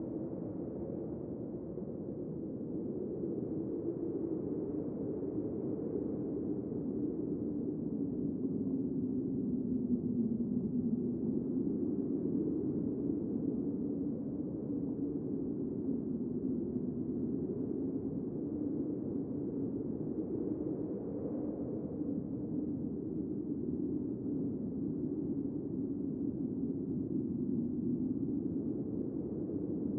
Sound effects > Electronic / Design
WINDDsgn Howling Wind 1 ZAZZ
Windy, Wind, Natural